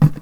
Sound effects > Other mechanisms, engines, machines
metal shop foley -213
strike, percussion, fx, sound, little, metal, tools, shop, bam, oneshot, bop, tink, crackle, knock, perc, bang, foley, thud